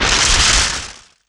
Sound effects > Natural elements and explosions
Lofi non-explosive ignition sound of a match or gas fire. Foley emulation using wavetable synthesis and noise tables.